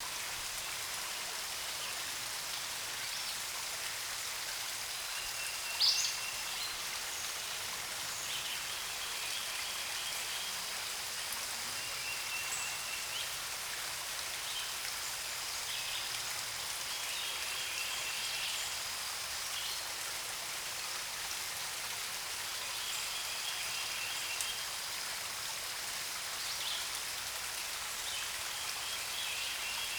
Soundscapes > Nature
Field recording of a small stream on a woodland trail. Used a Sony PCM A10.